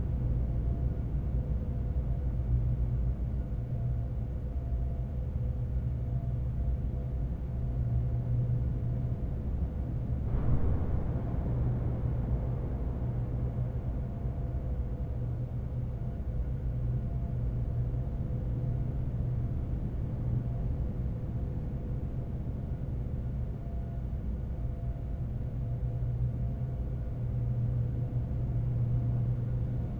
Soundscapes > Indoors
Ambiance - Bunker (Roomtone) - Loop.